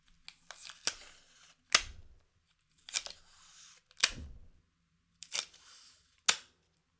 Sound effects > Objects / House appliances
Tape Pull Rip
Pulling and ripping pieces of office tape. Use how you'd like. Recorded on Zoom H6 and Rode Audio Technica Shotgun Mic.
ripping, pull, office, pulling